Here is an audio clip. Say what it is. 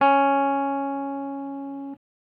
Instrument samples > String
Random guitar notes 001 CIS4 03
electric, electricguitar, guitar, stratocaster